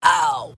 Sound effects > Other
This Sound Is My Voice And Also This Sound Was Created On: "November 8, 2022"